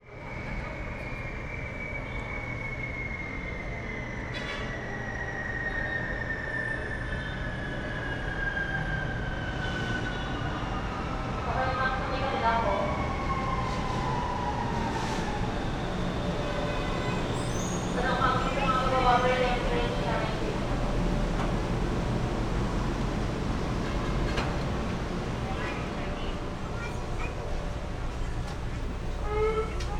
Soundscapes > Urban
250806 102402 PH Travelling in LRT through Manila
Traveling in lRT (light rail transit) through Manila (binaural, please use headset for 3D effects). I made this binaural recording while travelling in LRT (light rail transit) through Manila (Philippines), from Redemptorist – Aseana station to Carriedo station. First, one can hear the train ariving, then, while i'm inside, passengers chatting, the train doors opening and closing, the buzzer when the doors close, voices announcements, and more. At the end of the file, I exit from the train, and while I’m walking, one can hear the atmosphere of the train station, and a police siren in the street. Recorded in August 2025 with a Zoom H5studio and Ohrwurm 3D binaural microphones. Fade in/out and high pass filter at 60Hz -6dB/oct applied in Audacity. (If you want to use this sound as a mono audio file, you may have to delete one channel to avoid phase issues).
ambience, atmosphere, binaural, buzzer, children, doors, field-recording, horn, kids, LRT, Manila, men, noise, noisy, passengers, people, Philippines, police-siren, soundscape, train, voices, women